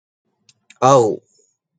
Sound effects > Other
gho-sisme
voice, male, arabic